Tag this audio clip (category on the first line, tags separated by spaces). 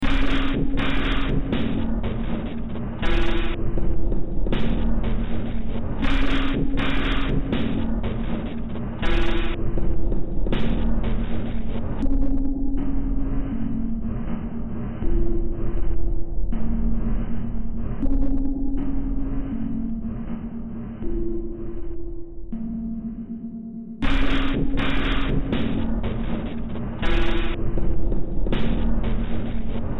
Music > Multiple instruments
Underground Noise Sci-fi Industrial Ambient Games Cyberpunk Horror Soundtrack